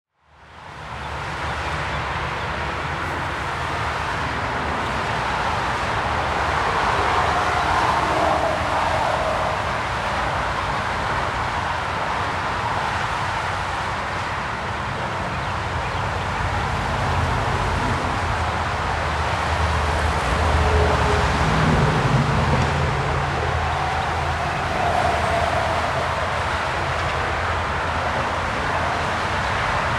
Sound effects > Vehicles
A stereo recording of a busy suburban highway in Northern Virginia - Rt. 66 at rush hour. The mics were about 50m away, though I'd like to get closer. I always found the noise of this highway enchanting.